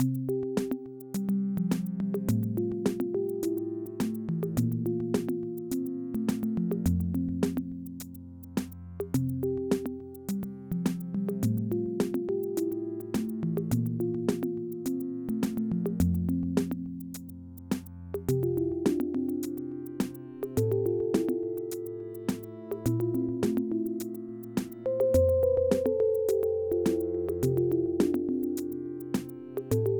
Music > Multiple instruments
Frutiger Aero Incoming Call Ringtone - "New Day"
Incoming call ringtone in the style of early 2000s Frutiger aero. 105 bpm, made in FL Studio using FL BooBass, reverb, delay, EQ, and patcher. The sound of receiving a call on a new messenger app in 2005.
2000s, aero, calling, frutiger, frutiger-aero, incoming-call, operating-system, ringtone, skype, tone, voice-call